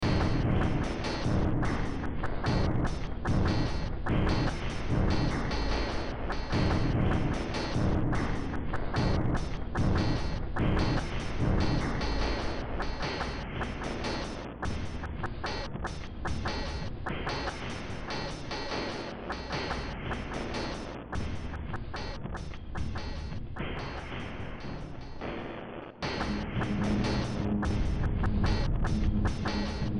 Music > Multiple instruments
Demo Track #3573 (Industraumatic)
Games, Horror